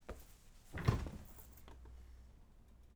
Sound effects > Objects / House appliances
Man falls on old sofa. Recorded with M-Audio M-TRACK II and pair of Soyuz 013 FET mics.
home, human